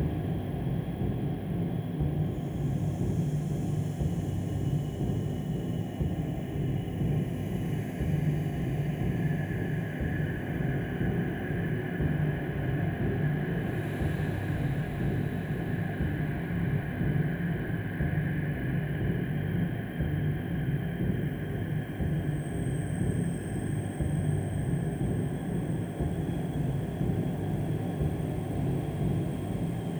Soundscapes > Synthetic / Artificial
Horror Atmosphere Ambience - created by layering various field recordings and foley sounds and applying processing and effects. A readymade loop that can be extended to any length required, mixed with voiceover in mind.